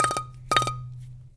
Music > Solo instrument
Marimba Loose Keys Notes Tones and Vibrations 14-001
loose, notes, block, keys, wood, percussion, thud, rustle, woodblock, foley, fx, oneshotes, tink, marimba, perc